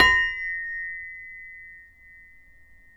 Sound effects > Other mechanisms, engines, machines
metal shop foley -053
fx, perc, pop, crackle, sfx